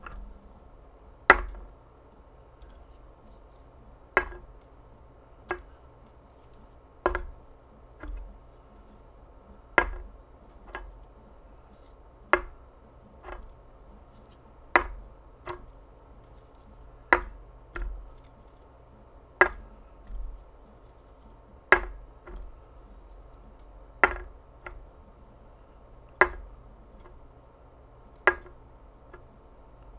Sound effects > Objects / House appliances
OBJCont-Blue Snowball Microphone Aluminum Can, Pick Up, Put Down Nicholas Judy TDC
An aluminum can picking up and putting down.
aluminum, Blue-brand, foley, put-down